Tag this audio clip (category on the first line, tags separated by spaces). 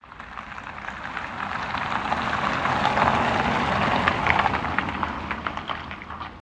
Sound effects > Vehicles
driving vehicle electric